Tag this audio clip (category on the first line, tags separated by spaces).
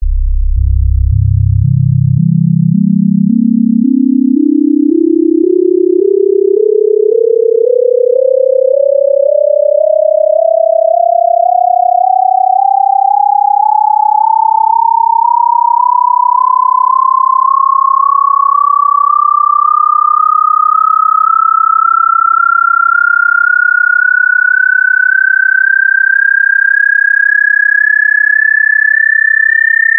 Instrument samples > Synths / Electronic
MODX FM-X Yamaha Montage